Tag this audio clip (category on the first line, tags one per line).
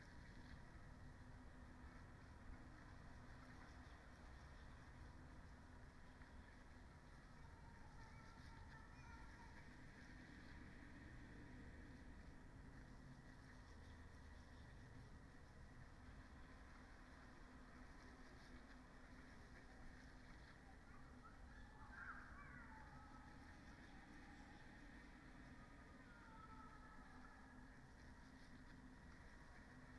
Soundscapes > Nature
natural-soundscape
raspberry-pi
alice-holt-forest